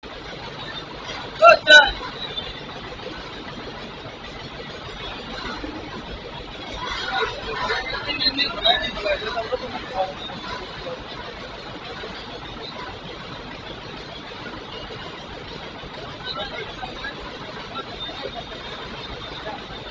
Speech > Conversation / Crowd
normal noisy conversation
In a noisy real background of a street people talking ang some juice making machine engine is making sound. This was recorded with CPPlus IP Camera with in-built mic.
ambience, ambient, field, real, recording, street